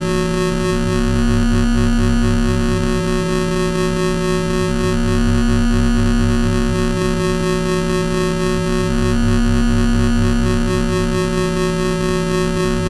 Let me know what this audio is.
Sound effects > Other mechanisms, engines, machines
IDM Atmosphare10( C note )
Hi ! That's not recording sound :) I synth it with phasephant!